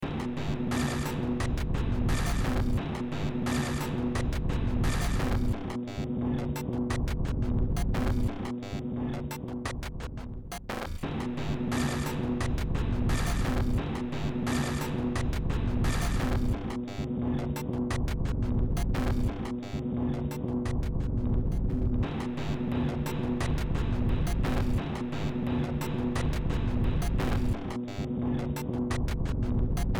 Music > Multiple instruments
Short Track #3172 (Industraumatic)
Industrial, Cyberpunk